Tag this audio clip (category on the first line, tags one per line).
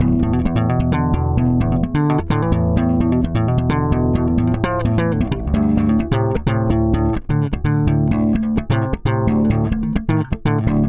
Music > Solo instrument
bass
bassline
basslines
blues
chords
chuny
electric
electricbass
funk
fuzz
harmonic
harmonics
low
lowend
note
notes
pick
pluck
riff
riffs
rock
slap
slide
slides